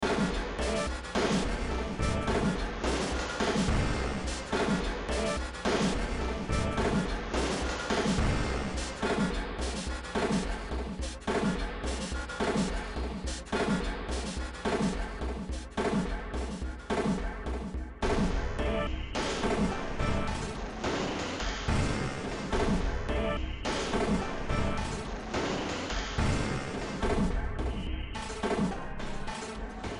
Music > Multiple instruments
Demo Track #4013 (Industraumatic)
Ambient, Cyberpunk, Games, Horror, Industrial, Noise, Sci-fi, Soundtrack, Underground